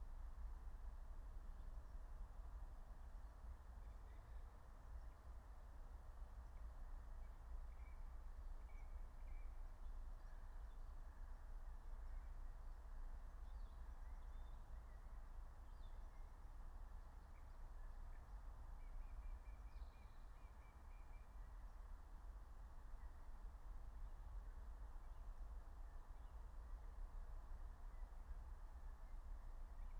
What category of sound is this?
Soundscapes > Nature